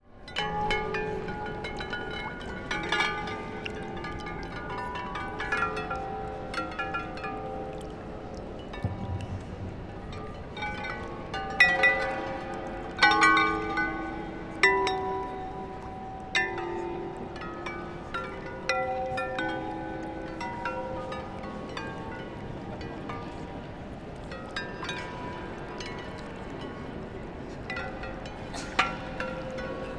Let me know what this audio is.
Sound effects > Other
250704 160707 FR Clinamen
Audio recording of Clinamen, an art installation exhibited at Bourse de Commerce (Paris, France), during summer 2025. One can hear the relaxing sound of this installation, around which the people can walk and sit if they like. For more details about it, here is the translation of the description made by Google : clinamen, an aquatic and musical installation by Céleste Boursier-Mougenot. This immersive project transforms the Rotunda into a space conducive to reverie, where an 18-meter diameter pool filled with water reflects the sky through the museum's dome. On this blue expanse, white porcelain bowls, set in motion by a gentle stream, generate melodious and incantatory sounds. These acoustic vibrations, created without the intervention of a performer, are the heart of the work, a true symphony of the moment, evolving with invisible waves.
relaxing, bowl, ambience, klang, Clinamen, bowls, dang, crowd, aquatic, ding, Paris, porcelain, motion, kling, hubbub, dong, acoustic, music, wavelets, atmosphere, echo, Bourse-du-commerce, water-stream, experimental, walla, relax, water, pool, soundscape, France